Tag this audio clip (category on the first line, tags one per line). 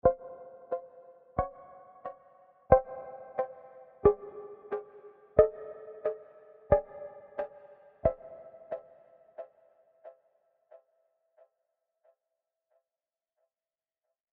Music > Other
loop
synth
45bpm